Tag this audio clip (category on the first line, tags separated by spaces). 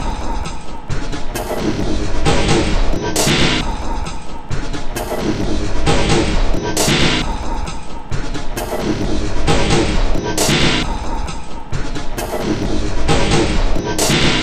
Instrument samples > Percussion
Alien Drum Industrial